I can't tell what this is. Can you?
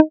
Instrument samples > Synths / Electronic
APLUCK 8 Eb
additive-synthesis, fm-synthesis, pluck